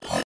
Sound effects > Electronic / Design
RGS-Glitch One Shot 9
Processed with ZL EQ and Waveshaper.
Effect, Glitch, Noise, FX, One-shot